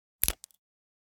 Sound effects > Other
Hard Plastic Crack
The recording features the sound of a piece of hard plastic breaking. Recorded using a Rode smartLav+ microphone and a Samsung Galaxy Note 9 phone.
plastic-break, plastic-shatter, breaking-plastic